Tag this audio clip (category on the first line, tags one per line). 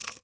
Sound effects > Electronic / Design
interface
ui
game